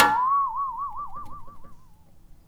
Sound effects > Other mechanisms, engines, machines
Handsaw Pitched Tone Twang Metal Foley 6
foley, fx, handsaw, hit, household, metal, metallic, perc, percussion, plank, saw, sfx, shop, smack, tool, twang, twangy, vibe, vibration